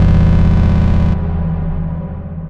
Instrument samples > Synths / Electronic
CVLT BASS 184
synth, subbass, clear, wobble, lfo, lowend, bass, subwoofer, sub, bassdrop, stabs, drops, subs